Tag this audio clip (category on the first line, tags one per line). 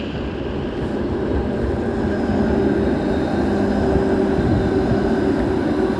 Sound effects > Vehicles
embedded-track
moderate-speed
passing-by
Tampere
tram